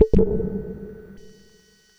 Instrument samples > Synths / Electronic
Benjolon 1 shot1
1SHOT, BENJOLIN, CHIRP, DIY, DRUM, ELECTRONICS, NOISE, SYNTH